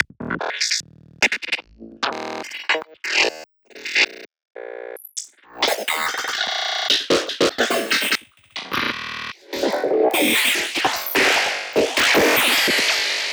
Sound effects > Electronic / Design
This is an FM synthesizer sample, twisted, distorted, and shredded to pieces — perfect for creative resampling. Designed for intense psy-trance production with raw, electric energy. The BPM and root key in the original project: 148 bpm G maj– but heavily distorted, so results may vary!
synth, psy-trance, electronic, fm, goa, loop, glitch